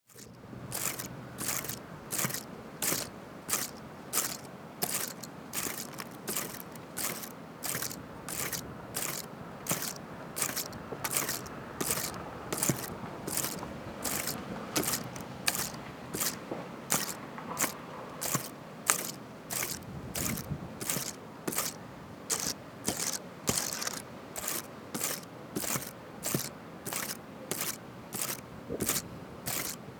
Sound effects > Natural elements and explosions
Experiment in which the spines of a cactus are scraped on the street.